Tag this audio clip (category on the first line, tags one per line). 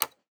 Sound effects > Human sounds and actions
activation
button
click
interface
off
switch
toggle